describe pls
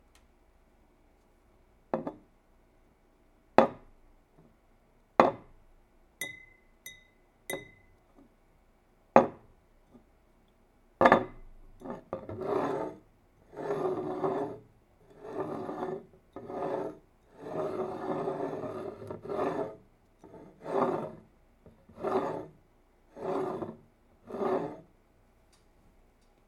Sound effects > Objects / House appliances
Glass cup on wood
I slide a glass cup around and set it down in a few different ways